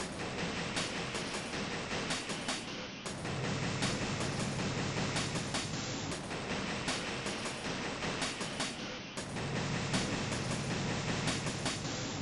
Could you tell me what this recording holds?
Instrument samples > Percussion
This 157bpm Drum Loop is good for composing Industrial/Electronic/Ambient songs or using as soundtrack to a sci-fi/suspense/horror indie game or short film.
Loopable, Soundtrack, Ambient, Underground, Alien, Weird, Industrial, Samples, Drum, Packs, Loop, Dark